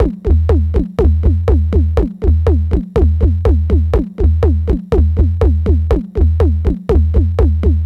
Music > Solo percussion
122 606Mod-BD-Toms Loop 07
606, Analog, Bass, Drum, DrumMachine, Electronic, Kit, Loop, Mod, Modified, music, Synth, Vintage